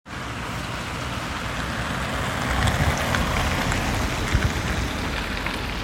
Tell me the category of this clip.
Sound effects > Vehicles